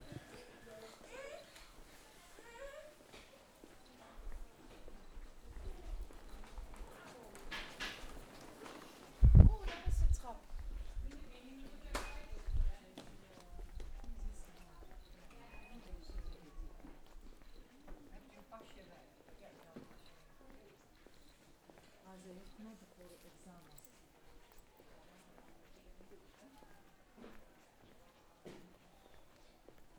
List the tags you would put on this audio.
Soundscapes > Indoors
general-noise soundscape ambiance

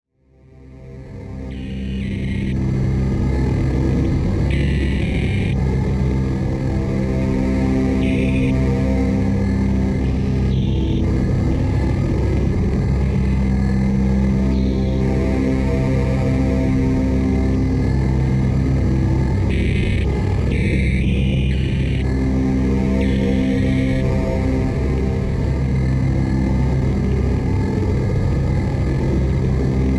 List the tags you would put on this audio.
Sound effects > Electronic / Design
drones evolving dark sci-fi synthetic digital

